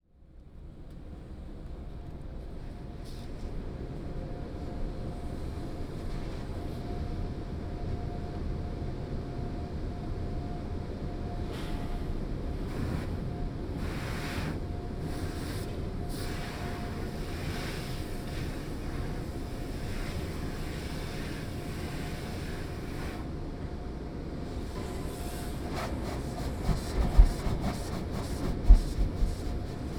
Sound effects > Vehicles
A binaural recording of a car wash. Recorded with the sound professionals miniature binaural microphones and Zoom H2E
3d, binaural, car, carwash